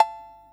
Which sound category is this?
Instrument samples > Synths / Electronic